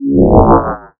Instrument samples > Synths / Electronic
DISINTEGRATE 1 Db
bass, fm-synthesis, additive-synthesis